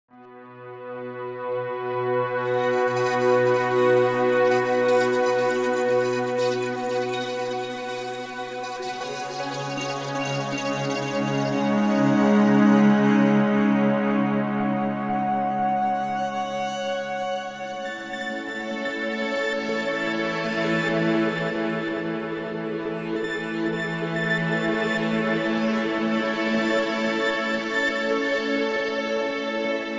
Soundscapes > Synthetic / Artificial
Ambient relaxing soundscape improvised with Moodscaper on iPad. It’s relaxing, meditative and changing - but not too much. Recorded with Tascam Portacapture X6.